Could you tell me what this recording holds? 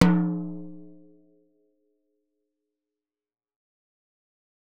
Music > Solo percussion
Hi Tom- Oneshots - 43- 10 inch by 8 inch Sonor Force 3007 Maple Rack
instrument, drumkit, beats, drum, fill, rimshot, perc, hi-tom, velocity, tom, flam, percs, beat, rim, tomdrum, beatloop, hitom, drums, roll, percussion, kit, toms, oneshot, acoustic, studio